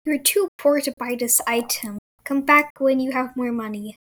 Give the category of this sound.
Speech > Solo speech